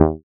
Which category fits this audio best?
Instrument samples > Synths / Electronic